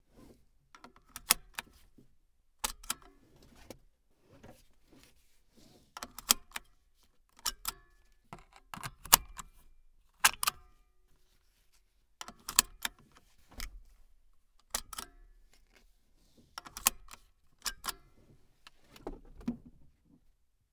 Sound effects > Vehicles
Locking/Unlocking Seatbelt
The click sounds of a seatbelt being locked and unlocked. Recorded with a 1st Generation DJI Mic and Processed with ocenAudio